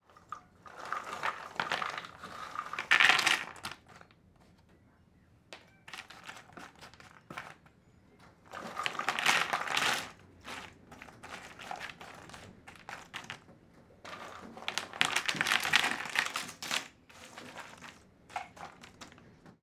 Sound effects > Human sounds and actions
GAMEBoard Tipping jigsaw puzzle pices from one box into another
Tipping jigsaw puzzle pieces from one cardboard box into another cardboard box.
cardboard, drop, dropping, hand, jigsaw, pieces, puzzle, rummage, rustle, sfx, sort, sorting